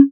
Instrument samples > Synths / Electronic
CAN 8 Db
bass, fm-synthesis, additive-synthesis